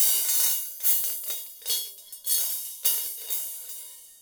Music > Solo instrument
Zildjian Sizzle Chain 16inch Crash-002
Crash, Custom, Cymbal, Cymbals, FX, Kit, Metal, Perc